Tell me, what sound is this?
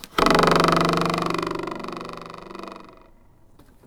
Sound effects > Other mechanisms, engines, machines
Handsaw Beam Plank Vibration Metal Foley 8
percussion, twang, foley, perc, handsaw, smack, twangy, sfx, fx, household, saw, shop, metallic, tool, vibe, hit, plank, metal, vibration